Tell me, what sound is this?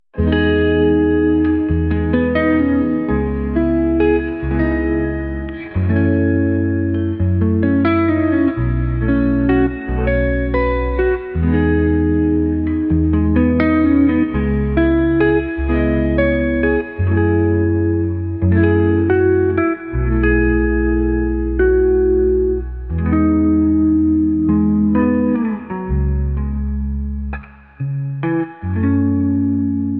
Instrument samples > Other
a voiceless music solo guitar full enjoy if want use this please describe my name SAEEDYAMCHI™